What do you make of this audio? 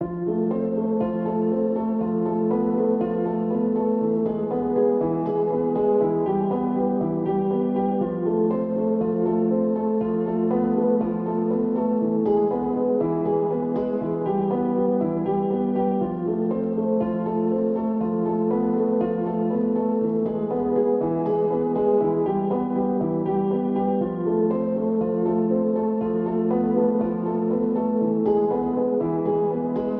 Music > Solo instrument
Piano loops 038 efect 4 octave long loop 120 bpm
120, 120bpm, free, loop, music, piano, pianomusic, reverb, samples, simple, simplesamples